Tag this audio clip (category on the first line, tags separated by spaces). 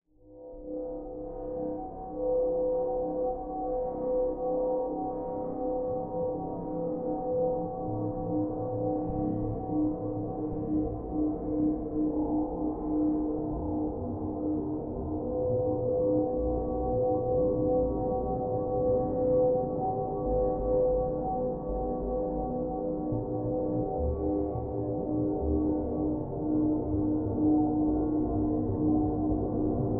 Music > Other
ambient cyberpunk drone scifi